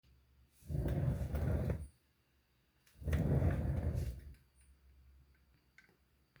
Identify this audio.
Sound effects > Objects / House appliances
Pulling out and back of swivel chair